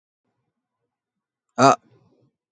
Sound effects > Other
alif- sisme
arabic, male, sound, vocal, voice